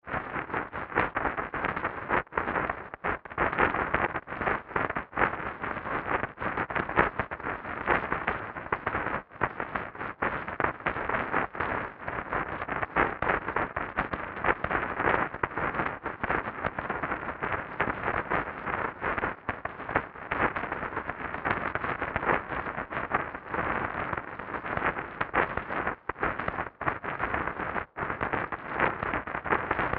Sound effects > Objects / House appliances
Error TV Noise 3
TV, Radio